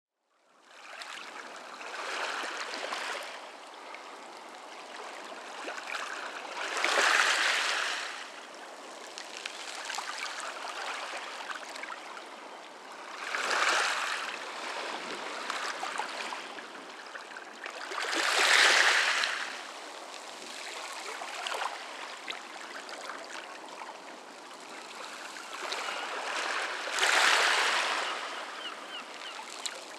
Soundscapes > Nature
Waves Lapping On The Shore
Waves lapping at the edge of the sea. Recorded on a phone.